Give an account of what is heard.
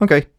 Speech > Solo speech
Relief - Okay
Man, ok, talk, oneshot, NPC, dialogue, Single-take, Male, Human, Voice-acting, FR-AV2, Video-game